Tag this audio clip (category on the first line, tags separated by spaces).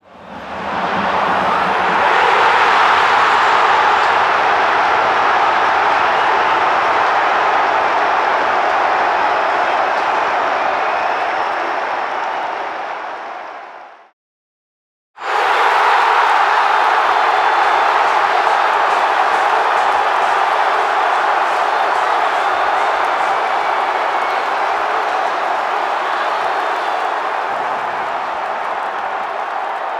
Soundscapes > Other
Football Goal